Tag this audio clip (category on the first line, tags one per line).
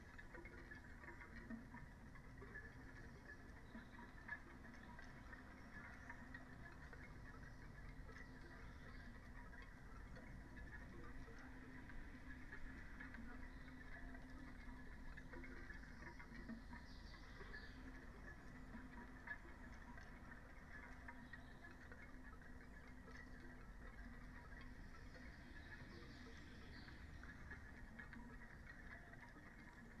Soundscapes > Nature
modified-soundscape
nature
raspberry-pi
weather-data